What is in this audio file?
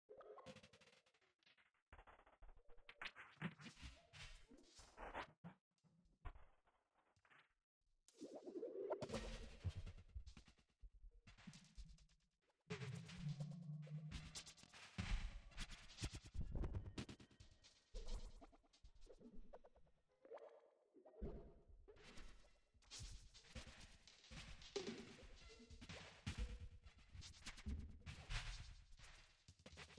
Music > Other
granular processing of sample